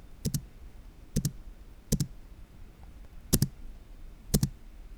Sound effects > Objects / House appliances
MBA Space-bar Multi-take MS
Subject : A keypress from a Macbook Air M2 Keyboard. Date YMD : 2025 03 29 Location : Saint-Assiscle, South of France. Hardware : Zoom H2N, MS mode. Weather : Processing : Trimmed and Normalized in Audacity.